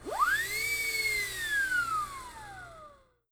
Sound effects > Objects / House appliances
TOONWhis-Blue Snowball Microphone, MCU Whoopee Whistle, Long Zing Nicholas Judy TDC
A long whoopee whistle zing.
cartoon, whoopee, zing, whistle, Blue-brand, whoopee-whistle, long